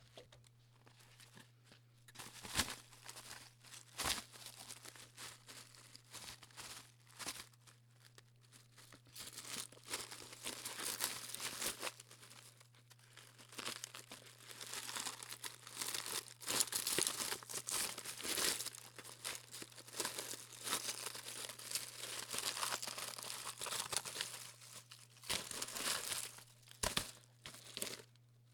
Sound effects > Natural elements and explosions
Dragging/digging at rocks and leaves
The sound of someone digging through undergrowth, rocks and leaves
digging, movement, dirt, rocks, leaves, drag, tearing